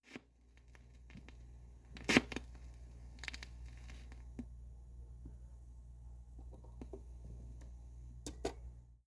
Sound effects > Objects / House appliances
COMPhono-Samsung Galaxy Smartphone Vinyl Record, Stop, Needle Up Nicholas Judy TDC
A vinyl record stops and needle up.
needle, Phone-recording, record, stop, up, vinyl